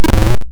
Sound effects > Electronic / Design
Optical Theremin 6 Osc dry-064
Sci-fi, DIY, Glitch, Theremin, Alien